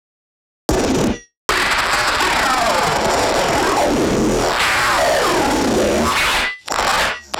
Sound effects > Electronic / Design
Optical Theremin 6 Osc Shaper Infiltrated-033
Sounds from an Optical Theremin I built from scratch that uses 3 Main Oscillators all ring modded to one another , each Oscillator is connected to 2 Photoresistors and an old joystick from PS2 controllers. The sounds were made by moving the unit around my studio in and out of the sun light coming through the skylights. further processing was done with Infiltrator, Rift, ShaperBox, and Reaper
Machine, Pulse, Theremin, Gliltch, FX, EDM, Impulse, SFX, Alien, DIY, Otherworldly, Saw, Noise, strange, Experimental, IDM, Weird, Oscillator, Crazy, Robot, Analog, Synth, Electronic, Chaotic, Loopable, Tone, Robotic, Mechanical, Electro